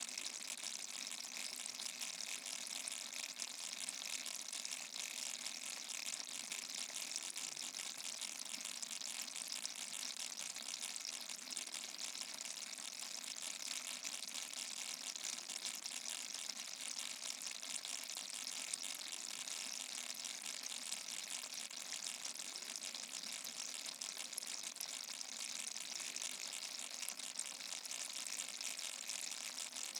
Sound effects > Animals
crayfish into cooler 1
Freshly caught Crayfish in a cooler, and their crackling words. Des écrevisses fraîchement pêchées dans une glacière, et leur langage de cliquetis et crépitements.
glaciere, crayfish, fishing, gambero, water, cooler, clicking, crackling, field-recording, crackle, crepitement, ecrevisse